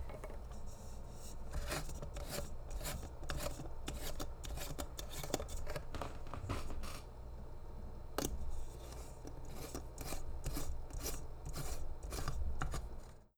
Sound effects > Objects / House appliances
FOLYProp-Blue Snowball Microphone Lightbulb, Unscrew, Screw Nicholas Judy TDC
Unscrewing and screwing a lightbulb.